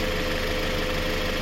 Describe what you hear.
Sound effects > Other mechanisms, engines, machines
clip auto (3)
Description (Car) "Car Idling: whirring fans, moving pistons, steady but faint exhaust hum. Close-range audio captured from multiple perspectives (front, back, sides) to ensure clarity. Recorded with a OnePlus Nord 3 in a residential driveway in Klaukkala. The car recorded was a Toyota Avensis 2010."
Auto, Avensis, Toyota